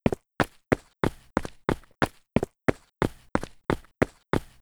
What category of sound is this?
Sound effects > Human sounds and actions